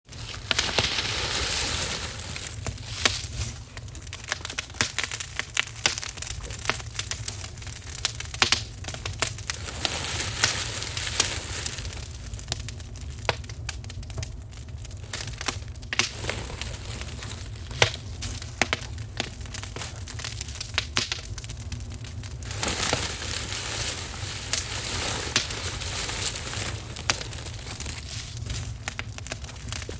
Soundscapes > Nature
Burning bean shells 11/30/2024
Burning bean shells
burning-fire, fire, bean